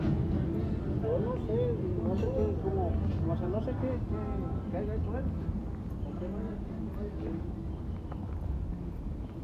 Soundscapes > Urban
CRWDConv conversation street outdoor city music DOI FCS2
people having a conersation outdoor city
conversation, outdoors, crowd